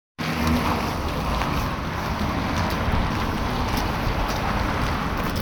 Soundscapes > Urban
car sound 3
Car, passing, studded